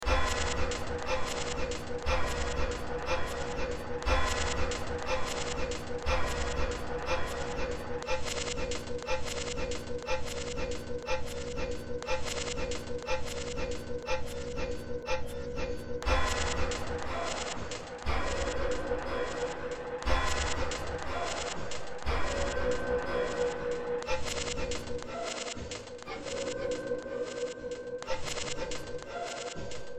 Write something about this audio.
Music > Multiple instruments
Demo Track #3303 (Industraumatic)
Ambient, Cyberpunk, Games, Horror, Industrial, Noise, Sci-fi, Soundtrack, Underground